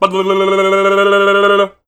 Sound effects > Human sounds and actions
TOONVox-Blue Snowball Microphone, CU Vocal Head Shake, Tongue Blabber Nicholas Judy TDC
A vocal head shake. Tongue blabber.
blabber, Blue-Snowball, cartoon, head, head-shake, tongue, vocal